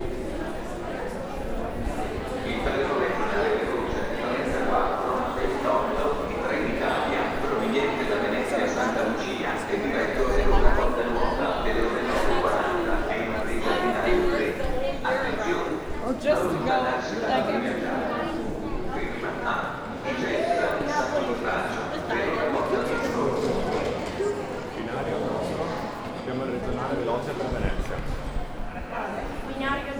Soundscapes > Urban
People Chatting while waiting for the Train from Venice to Verona
People chatting at the Padova train station while waiting for the train
chatting, city, field-recording, people, platform, rail, railway, railway-station, station, street, train, train-station, urban, voices